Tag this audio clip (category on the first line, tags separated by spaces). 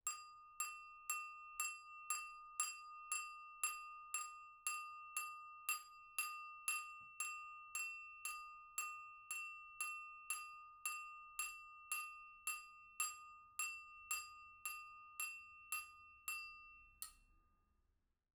Sound effects > Other
applause cling clinging FR-AV2 glass individual indoor NT5 person Rode single solo-crowd stemware Tascam wine-glass XY